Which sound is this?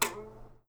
Music > Solo instrument
TOONPluk-Blue Snowball Microphone, MCU Ukelele String Nicholas Judy TDC
A ukelele string pluck.
pluck, string, Blue-brand, cartoon, Blue-Snowball, ukelele